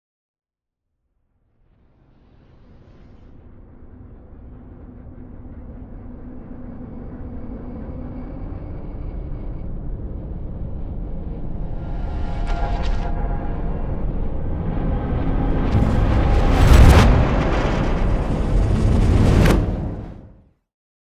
Sound effects > Other
Sound Design Elements SFX PS 081
impact, movement, trailer, whoosh, metal, transition, deep, reveal, effect, stinger, implosion, sub, indent, cinematic, bass, industrial, boom, hit, game, epic, riser, tension, sweep, video, explosion